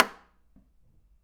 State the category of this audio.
Sound effects > Objects / House appliances